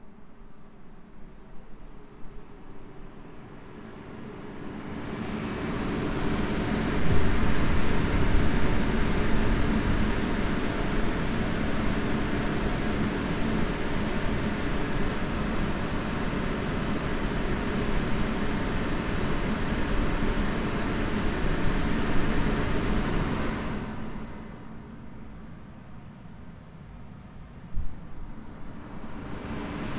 Soundscapes > Urban
Air vent with verb
An air vent with added reverb from a DAW